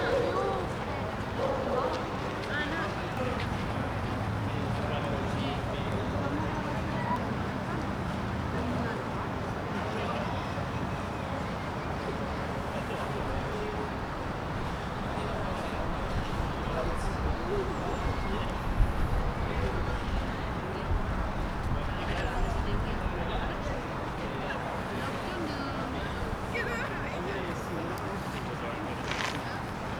Soundscapes > Urban
20250312 JardinsMontbau Humans,A lot of noise
Urban Ambience Recording in collab with Narcís Monturiol Institute, Barcelona, March 2025. Using a Zoom H-1 Recorder.
A, Humans, Jardins, lot, Montbau, noise